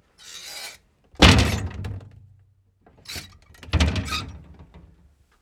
Sound effects > Objects / House appliances
Indoor Door with plastic glass window 2
Subject : A door inside the house, with a plasticky glass window. Date YMD : 2025 04 22 Location : Gergueil France Hardware : Tascam FR-AV2 and Rode NT5 microphones in a XY setup Weather : Processing : Trimmed and Normalized in Audacity. Maybe with a fade in and out? Should be in the metadata if there is.